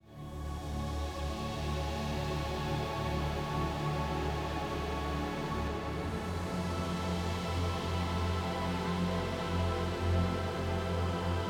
Instrument samples > Synths / Electronic
one-finger pad
One finger layered pad made in Tal Sampler